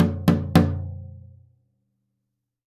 Music > Solo instrument
Toms Misc Perc Hits and Rhythms-010
Oneshot, Sabian, FX, Crash, Ride, Cymbals, Metal, Custom, Perc, Drums, Kit, Hat, Cymbal, Percussion, Paiste, Drum